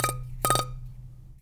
Music > Solo instrument
Marimba Loose Keys Notes Tones and Vibrations 13-001
rustle, block, woodblock, perc, thud, foley, oneshotes, loose, fx, wood, percussion, keys, notes, marimba